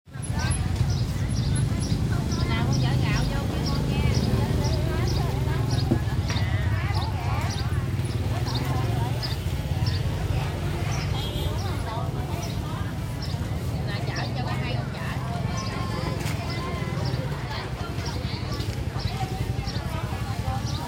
Soundscapes > Urban
Chợ Mương Trâu Sáng 2023.04.17 - Morning Chợ Mương Trâu
Sound in Chợ Mương Trâu morning. Record use iPhone 7 Plus smart phone 2023.04.17 07:29
morning, market, sell, business